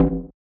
Music > Solo percussion
Native Percussions 1 High
Hi ! That's not recording sound :) I synth it with phasephant!
Bongo, Conga, drum, Enthnic, Native, Percussion